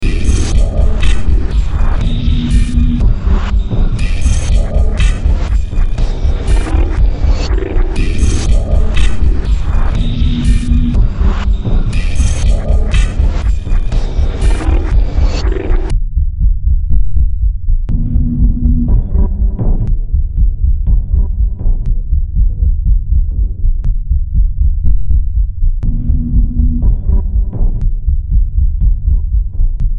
Music > Multiple instruments
Demo Track #3489 (Industraumatic)
Games, Soundtrack